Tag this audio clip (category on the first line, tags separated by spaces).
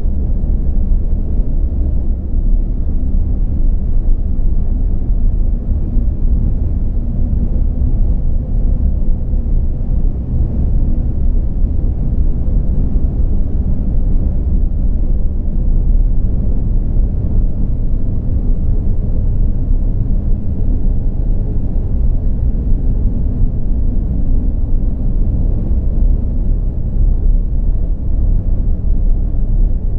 Sound effects > Experimental
starship propulsion-system deep flight zoom-h4n engines space rumbling tense movement